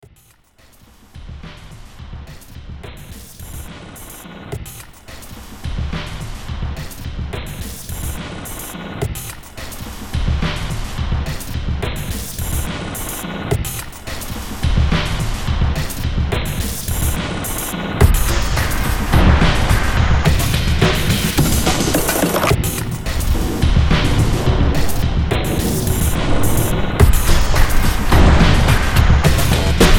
Music > Multiple instruments
Demo Track #4049 (Industraumatic)
Horror, Games, Cyberpunk, Noise, Ambient, Industrial, Underground